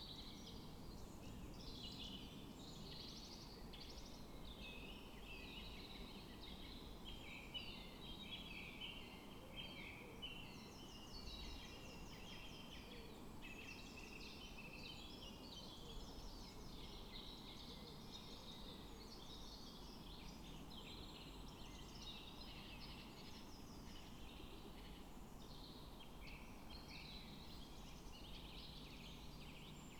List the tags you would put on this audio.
Soundscapes > Nature
modified-soundscape weather-data Dendrophone raspberry-pi soundscape nature natural-soundscape sound-installation artistic-intervention field-recording data-to-sound phenological-recording alice-holt-forest

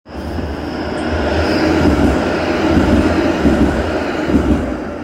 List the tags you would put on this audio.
Sound effects > Vehicles
public-transport city tram

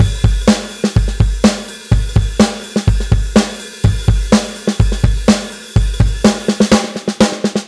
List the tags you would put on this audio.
Music > Other
breakbeat groovy

